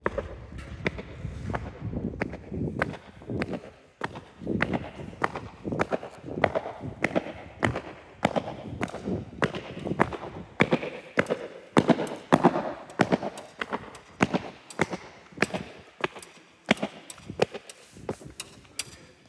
Soundscapes > Urban
Teufelsberg footsteps
walking around one of the Teufelsberg domes. Great space for reverberation